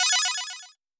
Sound effects > Electronic / Design
Designed coin pick up SFX created with Phaseplant and Vital.